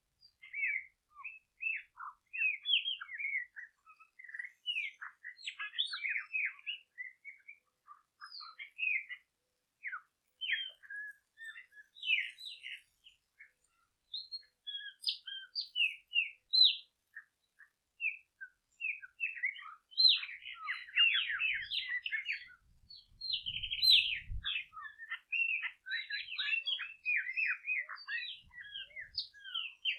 Soundscapes > Nature
This soundscape was captured within the Cerrado biome in central Brazil. The recording highlights the region's rich biodiversity, evidenced by the presence of many birds concentrated in a single tree. The audio underwent post-production in Audacity to eliminate wind interference and the corona noise emanating from a high-voltage power line. - Recording date: May 15, 2025 - 09:00. Autumn. - Recorder: Zoom, model H1N - Luziânia, State of Goiás, Brazil - 16° 16' 54'' S; 47° 36' 15'' W. Citation: ARAUJO, R. L., The Cerrado biome: an exceptional concentration of birds in a single tree.